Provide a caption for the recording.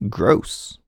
Speech > Solo speech
Displeasure - Gross
oneshot, eww, Voice-acting, Tascam, Human, unpleased, Neumann, U67, dialogue, Mid-20s, NPC